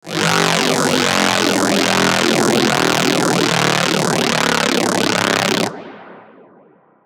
Sound effects > Electronic / Design
synthetic search and rescue (cyberpunk ambience)
some synthwave/cyberpunk style sound design this morning
80s, ambience, cyberpunk, electronic, retro, sfx, synth, synthwave, techno